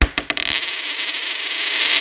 Sound effects > Objects / House appliances
OBJCoin-Samsung Galaxy Smartphone, CU Nickel, Drop, Spin 02 Nicholas Judy TDC
A nickel dropping and spinning.
spin, Phone-recording